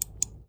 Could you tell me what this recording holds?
Sound effects > Objects / House appliances
Single mouse click using a HP MSU1158 USB Laser Mouse. Recorded with iPhone 15 Pro.